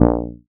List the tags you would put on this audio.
Instrument samples > Synths / Electronic
fm-synthesis additive-synthesis bass

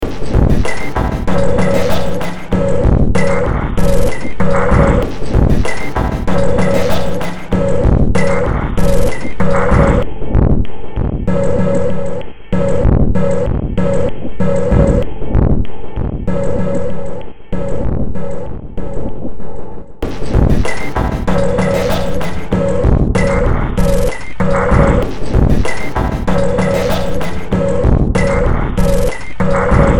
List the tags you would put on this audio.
Music > Multiple instruments
Industrial Games Cyberpunk Underground

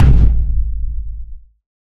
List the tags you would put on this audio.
Instrument samples > Percussion
ashiko bata bongo bougarabou djembe drum dundun DW floor floortom kettledrum Ludwig ngoma Pearl percussion Premier Sonor tabla taboret talkdrum talking-drum talktom Tama tambour tam-tam tenor-drum timpano tom tom-tom Yamaha

